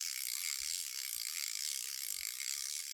Sound effects > Objects / House appliances
Sound of winding a fishing reel made with a ratchet wrench